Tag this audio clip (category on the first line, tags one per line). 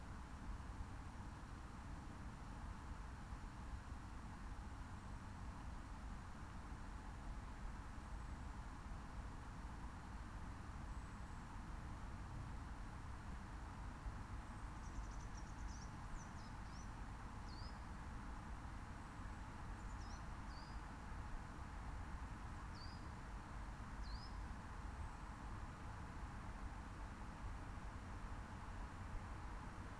Soundscapes > Nature
nature
phenological-recording
alice-holt-forest
soundscape
field-recording